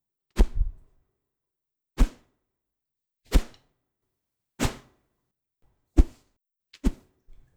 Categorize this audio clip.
Sound effects > Human sounds and actions